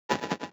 Sound effects > Electronic / Design
Glitch (Faulty Core) 5
audio-glitch, audio-glitch-sound, audio-glitch-sound-effect, computer-error, computer-error-sound, computer-glitch, computer-glitch-sound, computer-glitch-sound-effect, error-fx, error-sound-effect, glitches-in-me-britches, glitch-sound, glitch-sound-effect, machine-glitch, machine-glitching, machine-glitch-sound, ui-glitch, ui-glitch-sound, ui-glitch-sound-effect